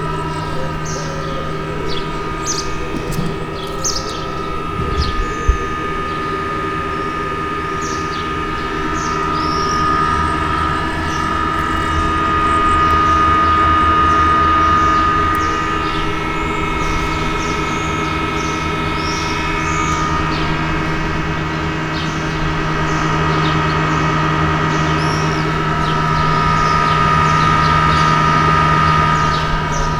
Sound effects > Other mechanisms, engines, machines
250629 Albi advertisement Billboard - Sound exploration MKE600
Subject : Exploring the different recording angles of a billboard. Date YMD : 2025 June 29 Sunday Morning (07h30-08h30) Location : Albi 81000 Tarn Occitanie France. Sennheiser MKE600 with stock windcover P48, no filter. Weather : Sunny no wind/cloud. Processing : Trimmed in Audacity. Notes : There’s “Pause Guitare” being installed. So you may hear construction work in the background. Tips : With the handheld nature of it all. You may want to add a HPF even if only 30-40hz.
Shotgun-mic, Outdoor, Sunday, Tarn, Occitanie, billboard, Early-morning, fan, MKE600, Sound-exploration, Hypercardioid, France, Morning, Albi, bill-board, 2025, June, 81000, City